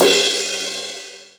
Instrument samples > Percussion
crash Zildjian 16 inches bassized long
Avedis, clash, crack, Meinl, multicrash, Paiste, polycrash, Sabian, sinocymbal, smash, Soultone, Zildjian